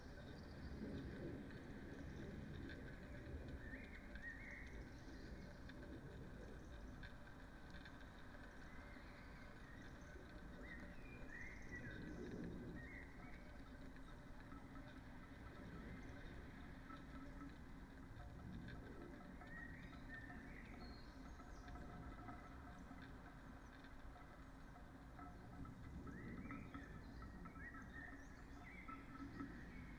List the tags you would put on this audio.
Soundscapes > Nature
sound-installation data-to-sound modified-soundscape soundscape weather-data natural-soundscape artistic-intervention raspberry-pi nature alice-holt-forest field-recording phenological-recording Dendrophone

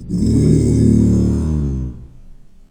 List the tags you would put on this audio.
Sound effects > Experimental
Alien Animal boss Creature Deep demon devil Echo evil Fantasy Frightening fx gamedesign Groan Growl gutteral Monster Monstrous Ominous Otherworldly Reverberating scary sfx Snarl Snarling Sound Sounddesign visceral Vocal Vox

